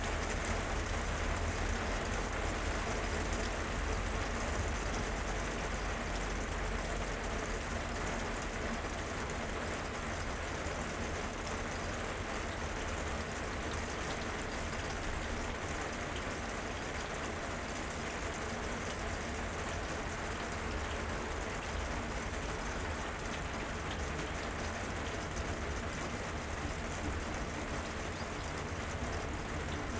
Soundscapes > Nature
ORTF Stereo with 2 x Lewitt LCT540S and Zoom F3 First Autumn Rain in the western algarve on a terrace with corrugated sheet roof, wood ground surrounded by plants and trees in an rural area